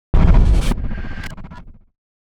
Sound effects > Other
Sound Design Elements Impact SFX PS 080
collision, game, blunt, hard, rumble, power, design, effects, shockwave, strike, hit, cinematic, sharp, percussive, crash, smash, sfx, impact, heavy, force, thudbang, sound, audio, explosion, transient